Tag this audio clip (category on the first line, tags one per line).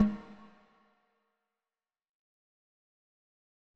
Music > Solo percussion
fx
rimshots
rimshot
drum
kit
roll
hits
ludwig
acoustic
realdrum
perc
drumkit
realdrums
processed
rim
snares
snareroll
crack
snaredrum
percussion
sfx
flam
reverb
drums
brass
snare